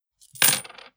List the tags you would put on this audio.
Sound effects > Other
metal
penny
falling
coins
drop
coin
gold
money
change
metalic
quarter
currency
dropping
dime
fall
table

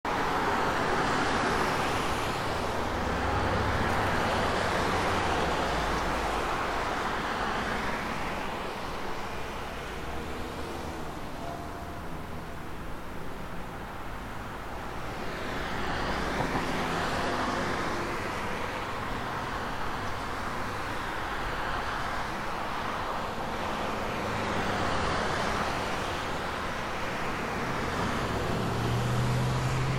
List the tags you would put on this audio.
Soundscapes > Urban
city
street
traffic

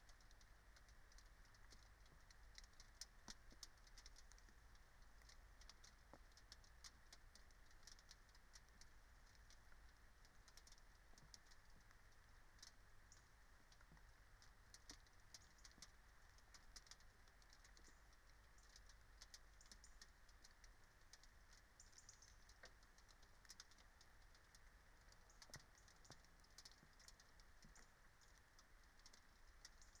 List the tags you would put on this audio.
Soundscapes > Nature
soundscape
field-recording
meadow
alice-holt-forest
natural-soundscape
nature
phenological-recording
raspberry-pi

